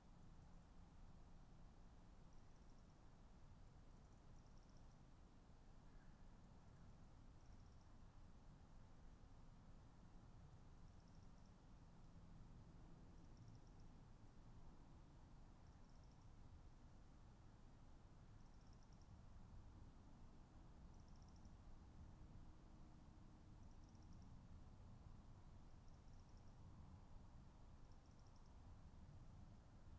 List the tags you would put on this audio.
Soundscapes > Nature
natural-soundscape soundscape alice-holt-forest sound-installation data-to-sound nature weather-data field-recording modified-soundscape phenological-recording artistic-intervention raspberry-pi Dendrophone

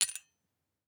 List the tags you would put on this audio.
Sound effects > Other mechanisms, engines, machines
glass sample hit garage

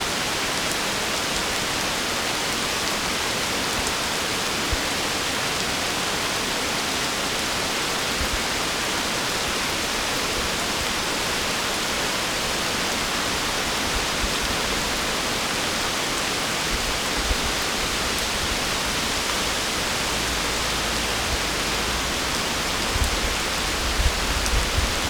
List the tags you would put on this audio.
Soundscapes > Nature
Environment Nature Storm Weather Rain Water

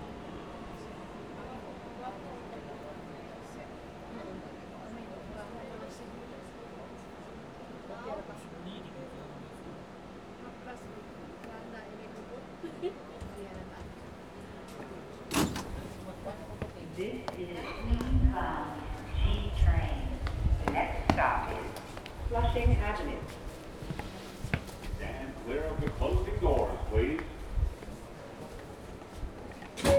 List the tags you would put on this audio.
Soundscapes > Urban
doors open steps subway underground

